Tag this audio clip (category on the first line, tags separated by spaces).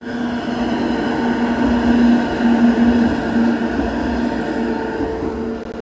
Sound effects > Vehicles
sunny tampere tram